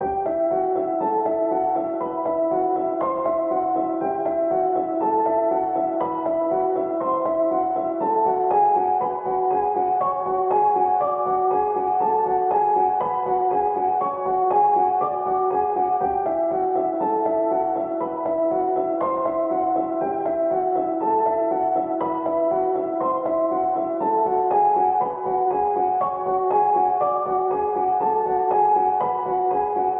Music > Solo instrument
Piano loops 127 efect 4 octave long loop 120 bpm
samples, music, simplesamples, 120, 120bpm, reverb, piano, free, loop, simple, pianomusic